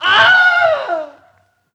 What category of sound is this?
Sound effects > Human sounds and actions